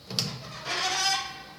Sound effects > Objects / House appliances
Metal door being opened. Recorded with my phone.
creak, door, metal, open, opening